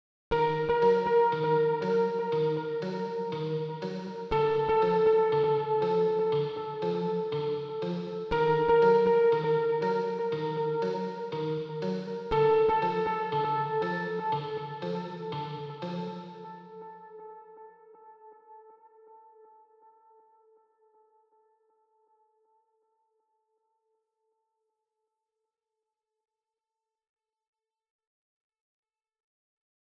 Music > Other
Short musical excerpt Mystery / Passing time Made with MIDI sounds in Ableton Live
midi, passingtime